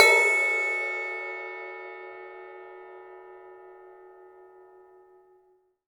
Music > Solo instrument
Sabian 15 inch Custom Crash-16
15inch, Crash, Custom, Cymbal, Cymbals, Drum, Drums, Kit, Metal, Oneshot, Perc, Percussion, Sabian